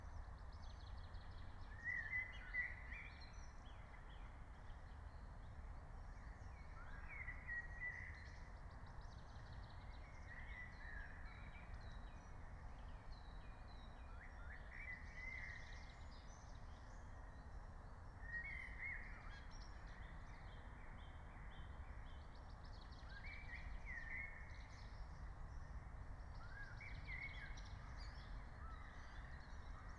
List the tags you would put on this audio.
Soundscapes > Nature
phenological-recording soundscape alice-holt-forest nature natural-soundscape field-recording meadow raspberry-pi

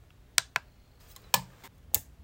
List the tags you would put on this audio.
Sound effects > Other mechanisms, engines, machines
switch sound-effect field-recording light

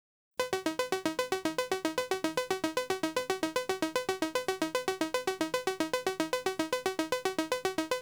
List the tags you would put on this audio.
Music > Solo instrument
synth 1lovewav loop arpeggio arp electronic

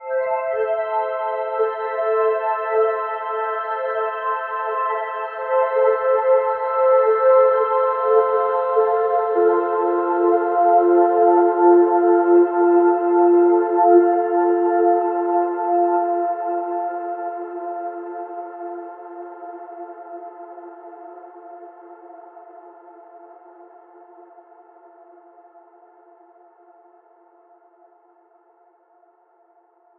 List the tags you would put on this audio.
Sound effects > Electronic / Design
ambient electronic fx sound-design sound-effect synthetic texture

